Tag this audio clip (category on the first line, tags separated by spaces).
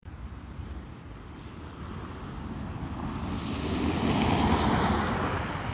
Sound effects > Vehicles
car engine vehicle